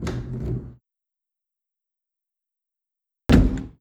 Sound effects > Objects / House appliances
A shed door opening and closing. Recorded at The Home Depot.